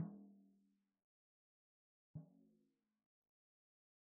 Music > Solo percussion
Floor Tom Oneshot -015 - 16 by 16 inch
acoustic, beat, beatloop, beats, drum, drumkit, drums, fill, flam, floortom, instrument, kit, oneshot, perc, percs, percussion, rim, rimshot, roll, studio, tom, tomdrum, toms, velocity